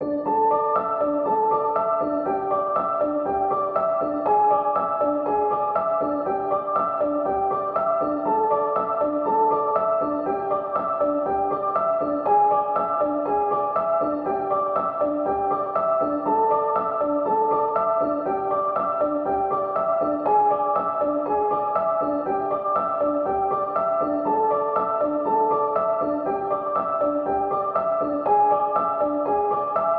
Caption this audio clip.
Music > Solo instrument
Piano loops 125 efect 4 octave long loop 120 bpm
120, 120bpm, free, loop, music, piano, pianomusic, reverb, samples, simple, simplesamples